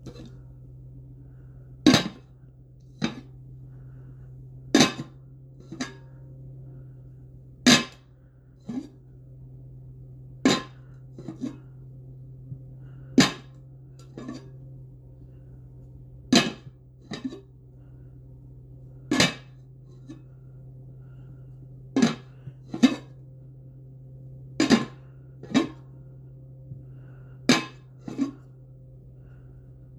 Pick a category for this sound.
Sound effects > Objects / House appliances